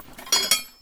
Sound effects > Other mechanisms, engines, machines
metal shop foley -083
bam, bang, boom, bop, percussion, pop, wood